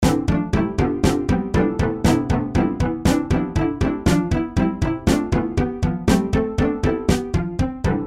Music > Multiple instruments
It is a guitar beat made with chrome music lab, I'm not good at description
Fun, Beat, guitar